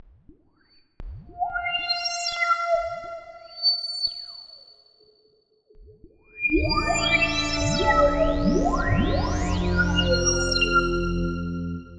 Soundscapes > Synthetic / Artificial
PPG Wave 2.2 Boiling and Whistling Sci-Fi Pads 11
cinematic, content-creator, dark-design, dark-soundscapes, dark-techno, drowning, horror, mystery, noise, noise-ambient, PPG-Wave, science-fiction, sci-fi, scifi, sound-design, vst